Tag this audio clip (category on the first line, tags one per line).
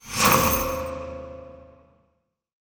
Sound effects > Electronic / Design
game
ui
interface